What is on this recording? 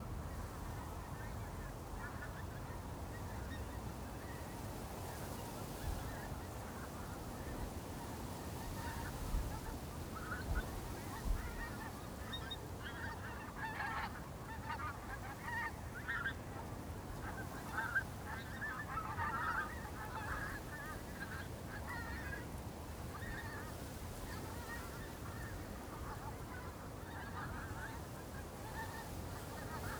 Soundscapes > Nature
Pink footed geese at dusk roosting at Aberlady Bay. End of Storm Amy. Line Audio CM4s, ORTF.
wind, bird-calls, soundscape, nature, geese, field-recording, birds, pink-footed-geese, dusk